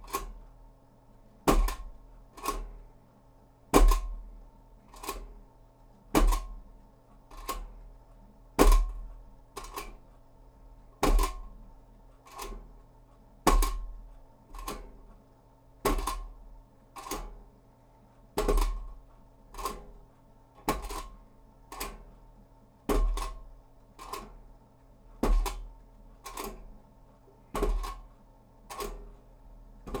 Sound effects > Objects / House appliances
OBJCont-Blue Snowball Microphone, CU Lunchbox, Metal, Pick Up, Set Down Nicholas Judy TDC
A metal lunchbox picking up and setting down.
Blue-Snowball, lunchbox